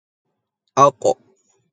Sound effects > Other
qo-sisme
arabic, male, sound